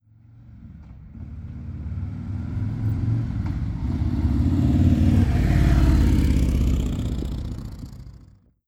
Sound effects > Vehicles
VEHMoto-Samsung Galaxy Smartphone Motorcycle, Pass By Nicholas Judy TDC
A motorcycle passing by.